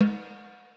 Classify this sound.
Music > Solo percussion